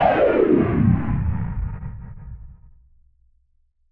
Sound effects > Electronic / Design
OBSCURE OBSCURE NETHER PUNCH
RAP, HIPHOP, BASSY, BOOM, UNIQUE, HIT, RUMBLING, EXPLOSION, LOW, TRAP, IMPACT, INNOVATIVE, DIFFERENT, EXPERIMENTAL, DEEP, RATTLING